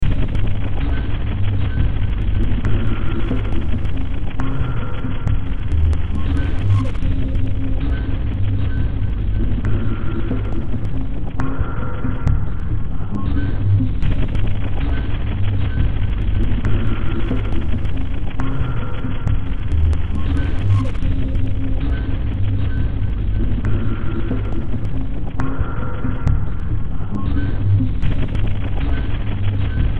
Music > Multiple instruments
Demo Track #3525 (Industraumatic)
Ambient, Cyberpunk, Games, Horror, Industrial, Noise, Sci-fi, Soundtrack, Underground